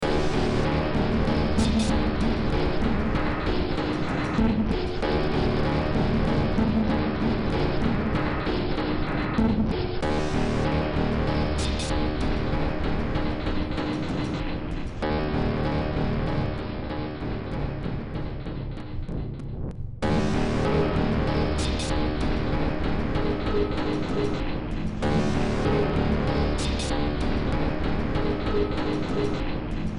Music > Multiple instruments
Demo Track #4012 (Industraumatic)
Cyberpunk, Noise, Sci-fi, Industrial, Soundtrack, Games, Ambient, Underground, Horror